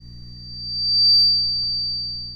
Sound effects > Electronic / Design
static Feddback 3
Shotgun mic to an amp. feedback.
radio, interference, shortwave